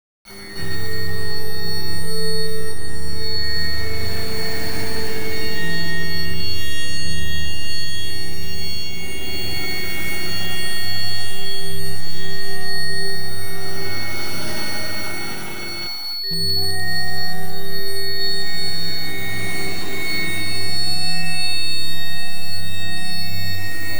Soundscapes > Synthetic / Artificial
Trickle Down The Grain 4
electronic, glitch, noise, sample, sfx